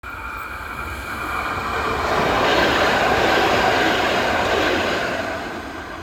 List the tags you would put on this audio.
Soundscapes > Urban
field-recording
Tram
railway

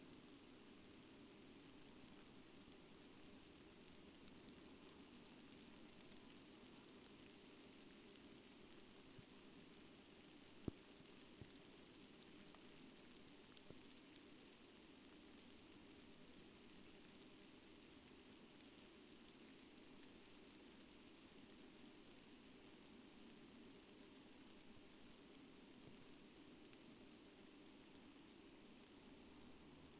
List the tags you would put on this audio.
Soundscapes > Nature
sound-installation
modified-soundscape
field-recording
data-to-sound
weather-data
alice-holt-forest
Dendrophone
artistic-intervention
natural-soundscape
phenological-recording
soundscape
nature
raspberry-pi

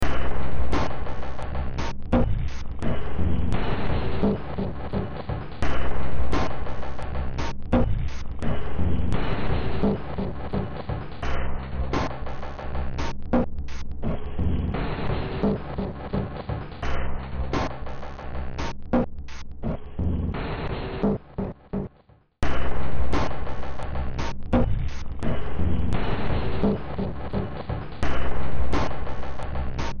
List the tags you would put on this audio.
Music > Multiple instruments
Sci-fi
Noise
Games
Underground
Ambient
Soundtrack
Horror
Industrial
Cyberpunk

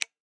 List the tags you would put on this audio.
Sound effects > Human sounds and actions
toggle
off
switch
click
button
activation
interface

